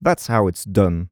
Speech > Solo speech
Date YMD : 2025 July 29 Location : Indoors France. Inside a "DIY sound booth" which is just a blanket fort with blankets and micstands. Sennheiser MKE600 P48, no HPF. A pop filter. Speaking roughly 3cm to the tip of the microphone. Weather : Processing : Trimmed and normalised in Audacity.
2025, Adult, Calm, FR-AV2, Generic-lines, Hypercardioid, july, Male, mid-20s, MKE-600, MKE600, Sennheiser, Shotgun-mic, Shotgun-microphone, Single-mic-mono, Tascam, thats-how-its-done, VA, victory, Voice-acting